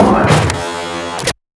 Sound effects > Other mechanisms, engines, machines
Sound Design Elements-Robot mechanism-005
actuators, automation, circuitry, clanking, clicking, design, digital, elements, feedback, gears, grinding, hydraulics, machine, mechanical, mechanism, metallic, motors, movement, operation, powerenergy, processing, robot, robotic, servos, sound, synthetic, whirring